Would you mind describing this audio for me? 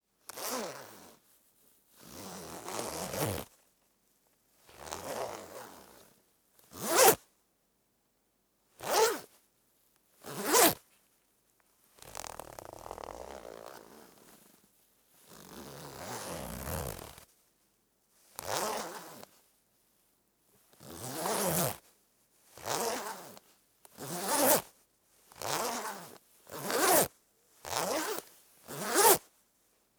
Sound effects > Objects / House appliances
The sound of a zipper lock. Recorded on Tascam Portacapture X8. Please write in the comments where you plan to use this sound. Don't forget to put five stars in the rating.